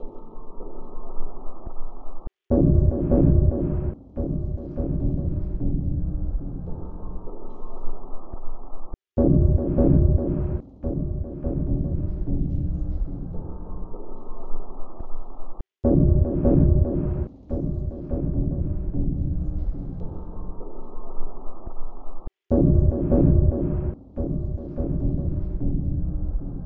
Instrument samples > Percussion
This 72bpm Horror Loop is good for composing Industrial/Electronic/Ambient songs or using as soundtrack to a sci-fi/suspense/horror indie game or short film.
Industrial, Loop, Loopable, Packs, Samples